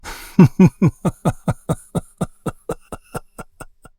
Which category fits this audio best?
Sound effects > Human sounds and actions